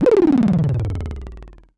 Sound effects > Experimental
Analog Bass, Sweeps, and FX-183
oneshot, alien, machine, retro, effect, bassy, pad, trippy, synth, electro, sample, snythesizer, sweep, sfx, fx, scifi, dark, complex, sci-fi, analogue, mechanical, electronic, weird, korg, robotic, vintage, analog, bass, basses, robot